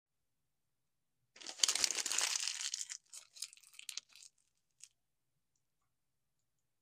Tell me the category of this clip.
Sound effects > Human sounds and actions